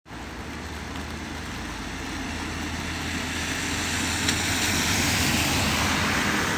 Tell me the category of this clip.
Sound effects > Vehicles